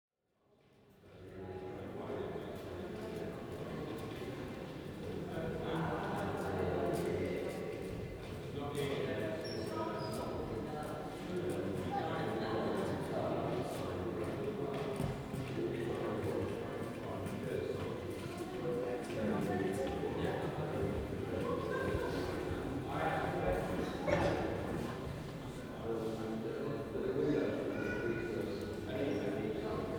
Soundscapes > Indoors
Recording 2 of the interior of Lichfield cathedral using Roland binaural CS10_EMs and a Zoom H6 Studio.